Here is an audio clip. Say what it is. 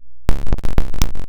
Sound effects > Electronic / Design
Optical Theremin 6 Osc dry-035
Robot, Robotic, DIY, Glitch, Sci-fi, Glitchy, Instrument, noisey, Infiltrator, Experimental, Bass, Sweep, Synth, FX, Scifi, Otherworldly, Electro, Theremins, Electronic, Handmadeelectronic, Trippy, SFX, Optical, Theremin, Noise, Spacey, Digital, Analog, Alien, Dub